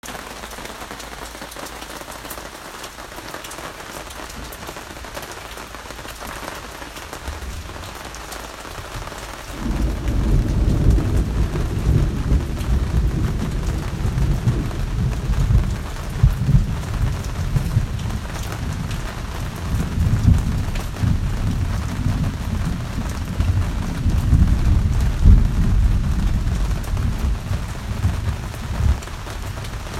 Soundscapes > Nature
Heavy Rain and Thunder
Heavy rain with a peal of thunder
Rain, storm, thunder, weather